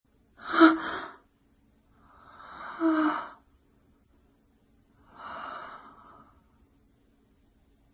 Sound effects > Human sounds and actions
A recording an actress made for me. This was going to be used in a video game I was working on but never finished. In this clip, the playable main heroine gets a game over and dies with all the drama she can muster. I hope you all can use this for something. I'd love to see it. Enjoy.